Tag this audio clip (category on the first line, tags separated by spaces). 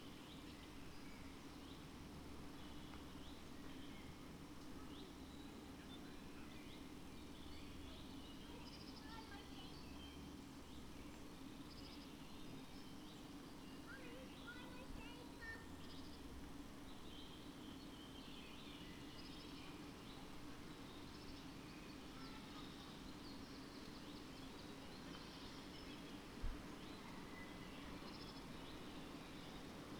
Soundscapes > Nature
data-to-sound alice-holt-forest phenological-recording weather-data field-recording raspberry-pi nature